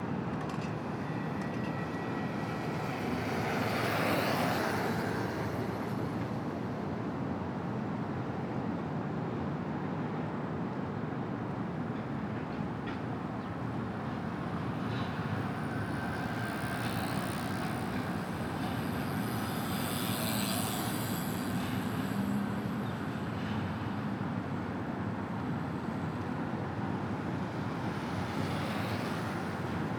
Soundscapes > Urban
Every year there is an event where several thousand people ride their bicycles around the city. This is one of the locations I chose to record, on a downhill coming off of a bridge in downtown so there are various other city sounds as well.
bike, city, crowd, cycle, field-recording, freewheel, riding, urban, voices